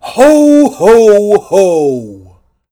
Speech > Solo speech
Santa laughing 'ho ho ho'.